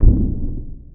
Sound effects > Electronic / Design
Previous-Moving in water/Swish-3
Previous Tag means it is not a mature sound I made. Sample used with signaturesounds 130 sound banks. Processed with phaseplant sampler, ZL EQ, Waveshaper, Camel Crusher, Khs Phase Distortion and Phaser.
Bubble, Splash, Swiming, Swish, Water